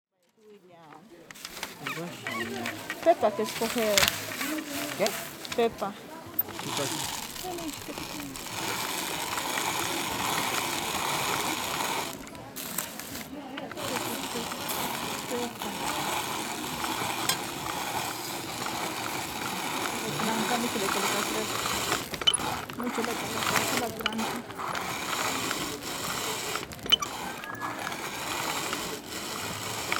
Sound effects > Objects / House appliances
Grinding cumin in La Cancha market in Cochabamba, Bolivia.